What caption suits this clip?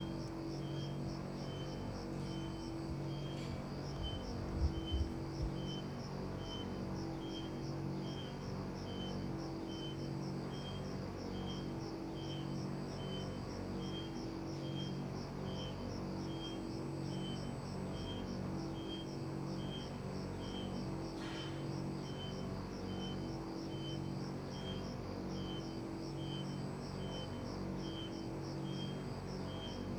Sound effects > Other mechanisms, engines, machines
Parabolic antenna ambience
recorded at Medicina Radio Observatory with zoom h6